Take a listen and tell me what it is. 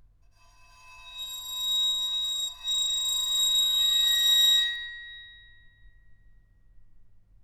Sound effects > Other
Bowing metal newspaper holder with cello bow 4
scary, atmospheric, effect, fx, metal, eerie, bow, horror